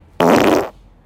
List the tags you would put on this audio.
Sound effects > Human sounds and actions
Flatulence
Gas

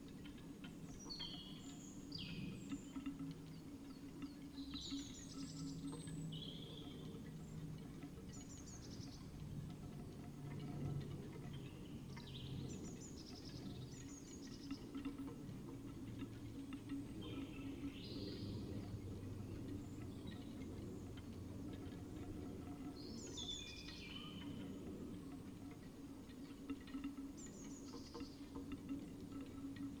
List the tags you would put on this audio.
Soundscapes > Nature
natural-soundscape
field-recording
alice-holt-forest
raspberry-pi
sound-installation
nature
artistic-intervention
data-to-sound
soundscape
modified-soundscape
weather-data
Dendrophone
phenological-recording

